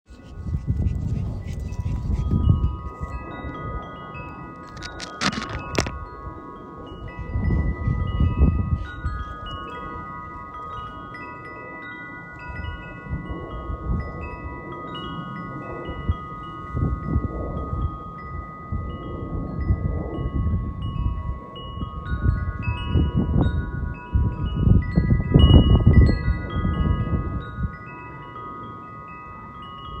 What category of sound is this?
Sound effects > Objects / House appliances